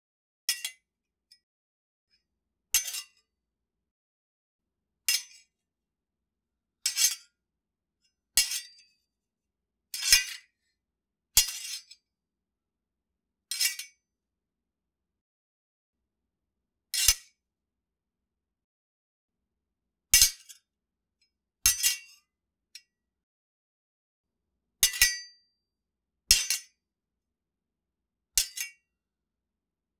Sound effects > Objects / House appliances
sword hit base w rattling sounds FINAL 07142025
Sword hits for fencing or blade locking sounds using cleaver knifes.